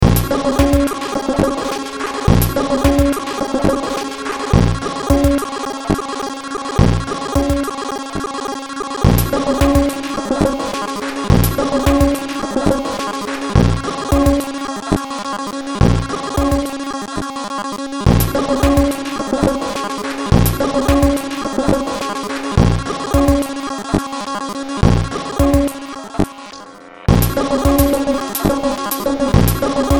Music > Multiple instruments
Short Track #3058 (Industraumatic)
Noise, Underground, Games, Ambient, Sci-fi, Horror, Industrial, Soundtrack, Cyberpunk